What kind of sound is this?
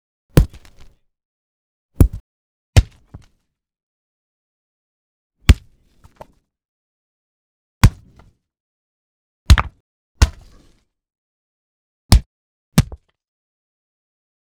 Sound effects > Natural elements and explosions
sounds of heavy rock impact on dirt.